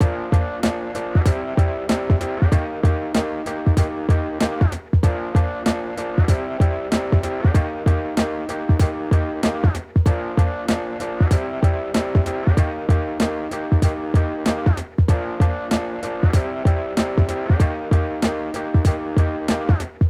Music > Multiple instruments
Guitar loops 123 09 verison 09 95.5 bpm
Guitar beat . VST plugins used . This sound can be combined with other sounds in the pack. Otherwise, it is well usable up to 4/4 95.5 bpm.
bpm, electric, electricguitar, free, guitar, loop, music, reverb, samples, simple, simplesamples